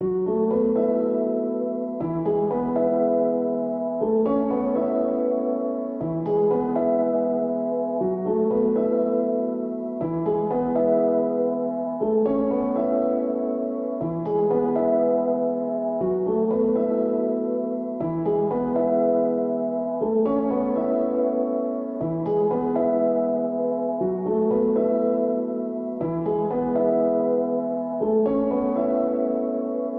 Music > Solo instrument
Piano loops 047 efect 4 octave long loop 120 bpm
120, 120bpm, free, loop, music, piano, pianomusic, reverb, samples, simple, simplesamples